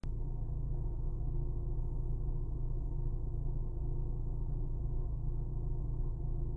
Sound effects > Vehicles
Engine Idle
Car engine idling from inside; Low, steady, somewhat muffled hum. Recorded on the Samsung Galaxy Z Flip 3. Minor noise reduction has been applied in Audacity. The car used is a 2006 Mazda 6A.
automobile, engine, car-interior, car, idle